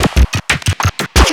Music > Other
This lop was made on the modular synthesiser with modules like Beads and Rample
Modulad, digital, glitch, loop, synth, electronic